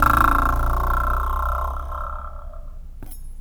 Sound effects > Objects / House appliances
knife and metal beam vibrations clicks dings and sfx-089
Vibration, Clang, Trippy, ding, Wobble, Klang, ting, metallic, FX, Vibrate, Metal, Perc, Beam, SFX, Foley